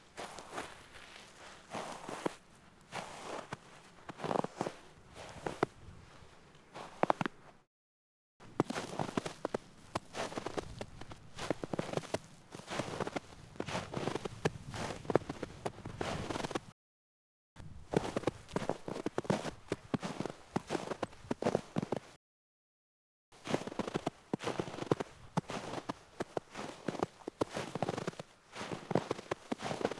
Sound effects > Human sounds and actions
The snowy season has arrived; Recording of footsteps in crunchy snow (slight wind) Recorded with the Sennheiser MKE600 on a Zoom H4n Pro
zoom, foley